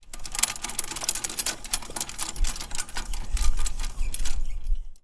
Sound effects > Objects / House appliances
Umbrella Hinge recording
Creaking, Hinge, Rattling